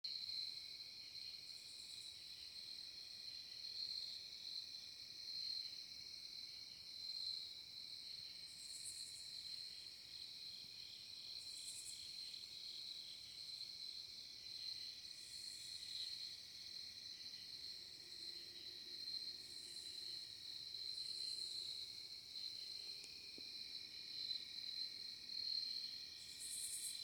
Soundscapes > Nature
Summer night in Alabama.